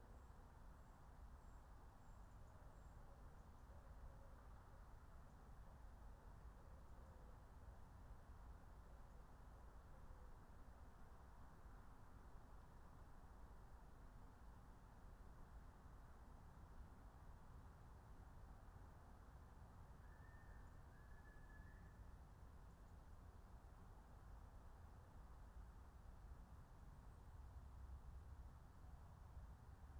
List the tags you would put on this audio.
Soundscapes > Nature
raspberry-pi
meadow
nature
natural-soundscape
soundscape
field-recording
phenological-recording
alice-holt-forest

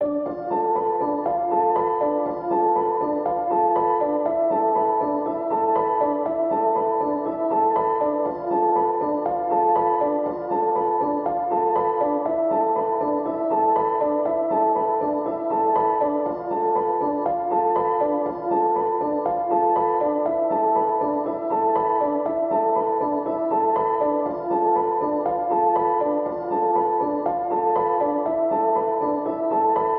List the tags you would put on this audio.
Music > Solo instrument
simplesamples
samples
piano
120
120bpm
free
pianomusic
reverb
simple
music
loop

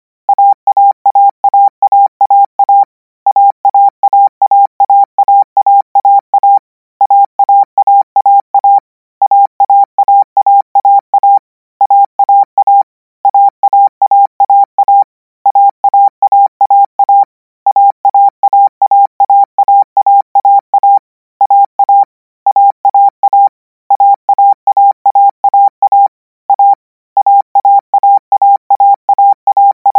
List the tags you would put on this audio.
Sound effects > Electronic / Design
code
radio
letters

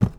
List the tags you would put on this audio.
Sound effects > Objects / House appliances
handle
household
container
object
knock
cleaning
tool
drop
tip
fill
plastic
lid
carry
slam
scoop
kitchen
pail
hollow
water
shake
pour
clatter
clang
liquid
bucket
metal
foley
garden
spill
debris

